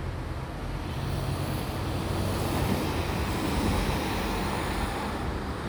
Sound effects > Vehicles
Sound of a bus passing by in Hervanta, Tampere. Recorded with a Samsung phone.